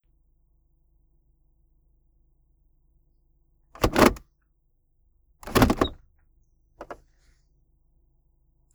Sound effects > Vehicles
Gear shifter on an automatic transmission; Shifter is moved through gears quickly twice. Fast mechanical sounds, with some very quiet metal ringing and squealing. Recorded on the Samsung Galaxy Z Flip 3. Minor noise reduction has been applied in Audacity. The car used is a 2006 Mazda 6A.